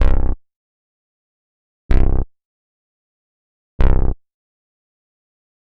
Instrument samples > Synths / Electronic
syntbas0013 C-x3-kr
VSTi Elektrostudio (ODSay)
bass, vst, vsti